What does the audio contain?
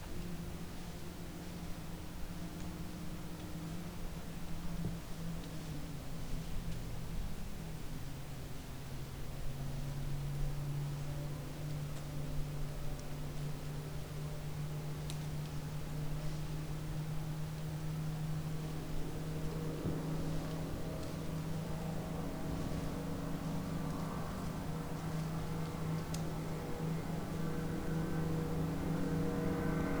Soundscapes > Urban
Autumn in the Woods in Cumberland, KY - distant boat traffic on the lake.